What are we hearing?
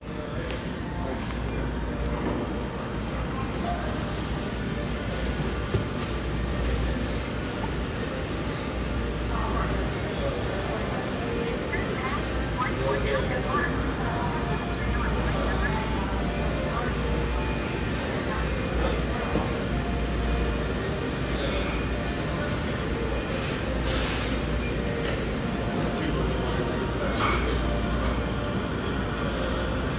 Sound effects > Human sounds and actions
A grocery store checkout area.